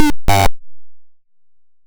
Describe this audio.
Sound effects > Electronic / Design
Optical Theremin 6 Osc dry-077
Glitchy, SFX, Spacey, noisey, Theremins, Dub, Digital, Electro, Experimental, Otherworldly, Sci-fi, Theremin, Robotic, Trippy, Glitch, Infiltrator, Noise, DIY, Optical, Sweep, Electronic, Robot, Alien, Handmadeelectronic, Instrument, FX, Bass, Scifi, Analog, Synth